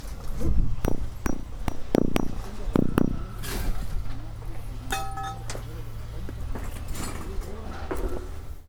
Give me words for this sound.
Sound effects > Objects / House appliances
Junkyard Foley and FX Percs (Metal, Clanks, Scrapes, Bangs, Scrap, and Machines) 157
dumping, Metal, Dump, Ambience, Foley, Percussion, Environment, Clank, dumpster, FX, Robotic, Machine, Metallic, waste, Robot, tube, Clang, scrape, Smash, Perc, Bang, SFX, Bash, rubbish, Junk, Junkyard, trash, Atmosphere, rattle, garbage